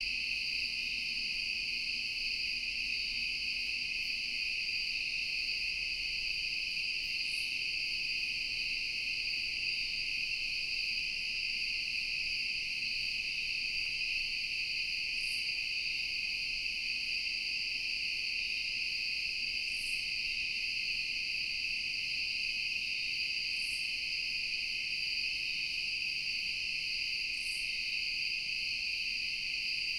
Soundscapes > Nature
Wooded Yard Crickets at Night in Autumn
Stereo field recording of a secluded, wooded back yard at night with prominent crickets. Recorded in Milford, Massachusetts on a Sound Devices 833 with an Audio Technica BP 4025 (XY Stereo).
Night, Forest, Crickets, Massachusetts, Autumn, Woods, Stereo, Bugs, Fall